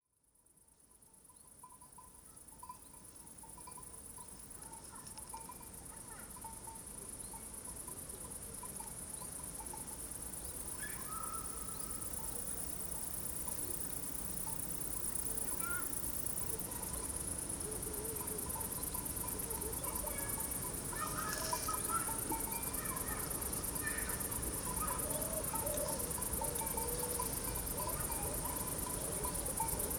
Soundscapes > Nature
mosquitos arise la vilaine meadow
Large summer meadow, late afternoon, mosquitos arrival. Faraway kids voices, orthopteras, few birds, sheep's bell....and other discreet presences (buzzard, frog). Long ambience, you can ear foregreound mosquitos arrival (well, I think they are mosquitos). Only some of them at the begining and then plenty! And then they stop. Funny to note that they sound less and less high pitch as time is passing by. The recording is long enough to experience the whole moment.